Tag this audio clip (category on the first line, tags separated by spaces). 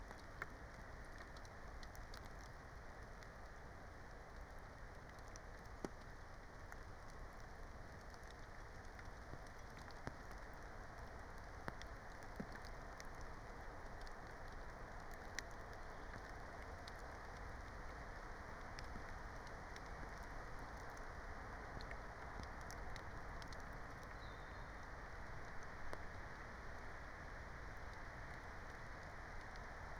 Soundscapes > Nature
meadow natural-soundscape phenological-recording soundscape